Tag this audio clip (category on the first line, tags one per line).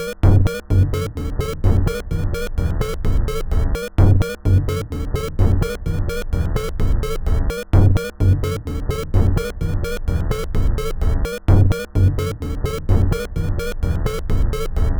Instrument samples > Percussion
Samples
Alien
Loopable
Packs
Loop
Industrial
Drum
Dark
Underground
Weird
Soundtrack
Ambient